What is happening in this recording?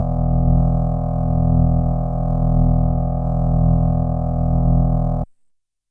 Instrument samples > Synths / Electronic
Synth organ patch created on a Kawai GMega synthesizer. E3 (MIDI 52)
digital, synth, patch, strange, kawai, dry, organ, gmega, bass